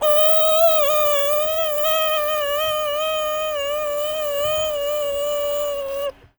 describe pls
Sound effects > Animals
TOONAnml-Blue Snowball Microphone, CU Mosquito Buzzing Nicholas Judy TDC
A cartoon mosquito buzzing.
Blue-brand, Blue-Snowball, buzz, cartoon, mosquito